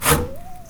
Sound effects > Other mechanisms, engines, machines
Handsaw Pitched Tone Twang Metal Foley 26
foley, fx, handsaw, hit, household, metal, metallic, perc, percussion, plank, saw, sfx, shop, smack, tool, twang, twangy, vibe, vibration